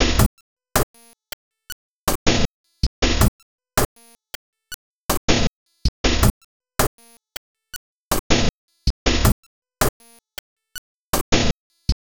Sound effects > Experimental
This 159bpm Glitch Loop is good for composing Industrial/Electronic/Ambient songs or using as soundtrack to a sci-fi/suspense/horror indie game or short film.
Packs, Weird, Drum, Soundtrack, Dark, Ambient, Alien, Samples, Industrial, Underground, Loopable, Loop